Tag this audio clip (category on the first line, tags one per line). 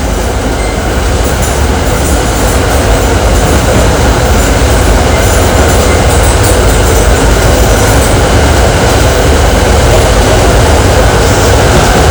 Soundscapes > Urban
metal
treads